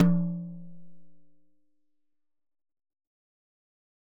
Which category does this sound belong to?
Music > Solo percussion